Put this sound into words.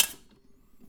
Sound effects > Other mechanisms, engines, machines
metal shop foley -197
bam, bang, boom, bop, crackle, foley, fx, knock, little, metal, oneshot, perc, percussion, pop, rustle, sfx, shop, sound, strike, thud, tink, tools, wood